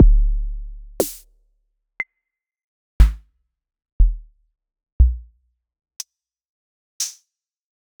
Instrument samples > Percussion
Lucia Drum Kit #001
snare, cowbell, hihat, tom, kit, percussion, kick, synth, drum, woodblock, thwack, rimshot